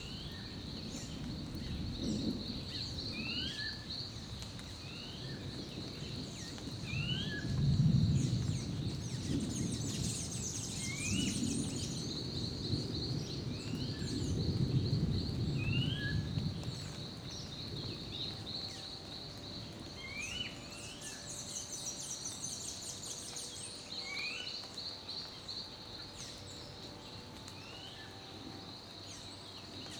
Soundscapes > Nature
A gentle rain and thunderstorm in a backyard of a rural town in Panama. Lots of various birds as well.